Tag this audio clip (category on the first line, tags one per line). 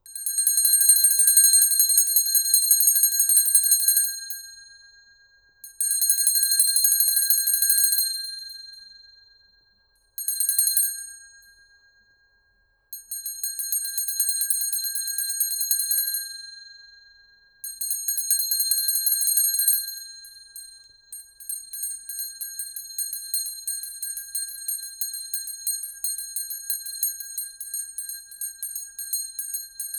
Sound effects > Objects / House appliances
hand
ring
Blue-brand
bell
Blue-Snowball
medium